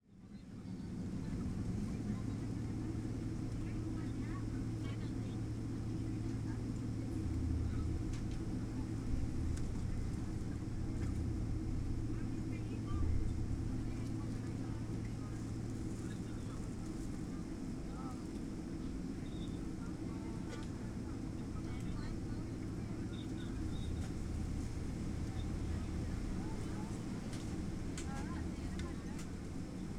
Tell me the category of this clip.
Soundscapes > Other